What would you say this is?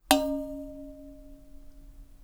Sound effects > Other mechanisms, engines, machines
Woodshop Foley-070
bam, bang, boom, bop, crackle, foley, fx, knock, little, metal, oneshot, perc, percussion, pop, rustle, sfx, shop, sound, strike, thud, tink, tools, wood